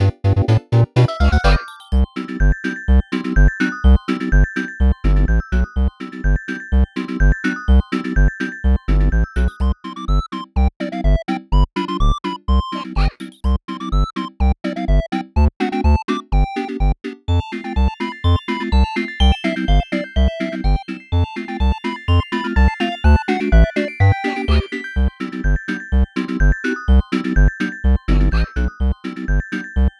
Music > Multiple instruments
“Out of money? Don’t worry, this thrilling Miss Yatta’s Wonderful Lottery Machine accepts the most universal currency of all - BLOOD! Our top prizes are TO DIE FOR! Just stick your hand in, pull the lever and hope for the best. WARNING: MISS YATTA AND AFFILIATES ASSUME NO RESPONSIBILITY FOR THE CONSEQUENCES OF USING THIS MACHINE.” Simply write the following in your project's credits/acknowledgements: "Ms. Then I put it in a D&D game. I was fascinated with the idea of "Miss Yatta" being a sickeningly cute mascot for a machine clearly designed by people providing an...err, unusual service for masochistic or financially disadvantaged individuals.